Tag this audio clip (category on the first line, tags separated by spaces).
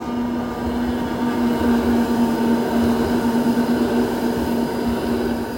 Sound effects > Vehicles
tram Tampere vehicle